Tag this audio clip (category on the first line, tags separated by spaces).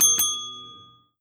Sound effects > Objects / House appliances
ring double Phone-recording bell desk